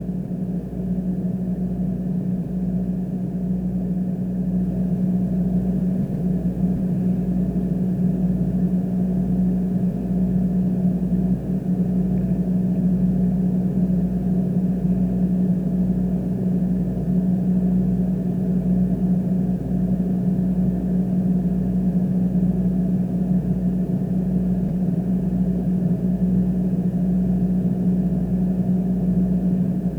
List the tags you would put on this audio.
Sound effects > Objects / House appliances
experimental abstract electric contact magnetic electricity noise fridge microphone kitchen appliance buzz appliances geladeira refrigerator contact-mic machine humming hum

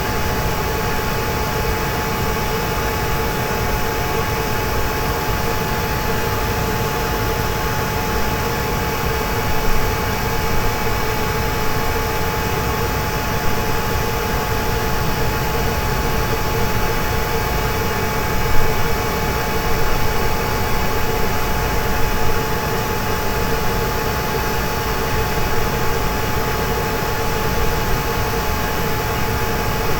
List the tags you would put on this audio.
Soundscapes > Urban
Rode FR-AV2 car-charger NT5o NT5-o Occitanie electric Early-morning Omni Single-mic-mono Albi 2025 Tarn City night 81000 August Tascam Mono station France

